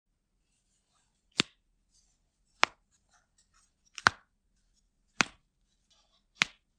Sound effects > Human sounds and actions
Knuckles Cracking
Made knuckle cracking sounds for a horror scene where fingers were being pulled back. Recorded using an iPhone SE and the sound was deepened using Audacity.
creepy, knuckles